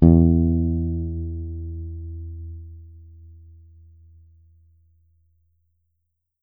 Instrument samples > String
E2 - Bass Guitar Finger Picked
E2 note finger picked on a Squire Strat converted Bass. Static reduced with Audacity.
bass, bass-guitar, E, E2, finger-picked